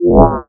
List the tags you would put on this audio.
Instrument samples > Synths / Electronic
additive-synthesis
bass
fm-synthesis

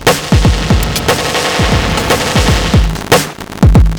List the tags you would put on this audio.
Music > Solo percussion
120bpm
chaos
industrial
loops
soundtrack
techno

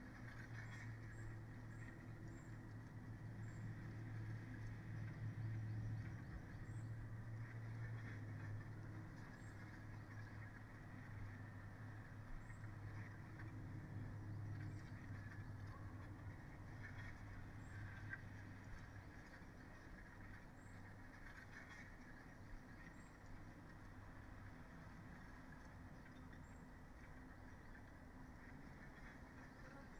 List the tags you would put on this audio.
Soundscapes > Nature
nature
weather-data
natural-soundscape
sound-installation
phenological-recording
raspberry-pi
artistic-intervention
Dendrophone
modified-soundscape
data-to-sound
field-recording
soundscape
alice-holt-forest

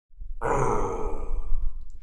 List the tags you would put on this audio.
Sound effects > Human sounds and actions
Creature
Grunt
Monster

